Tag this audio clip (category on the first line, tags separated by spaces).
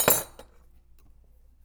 Sound effects > Objects / House appliances
Trippy ting Foley